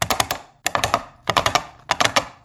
Sound effects > Animals
FEETHors-Samsung Galaxy Smartphone Horse Galloping, Coconut Shells, Looped 02 Nicholas Judy TDC
A horse galloping. Created using simulated coconut shells. Looped.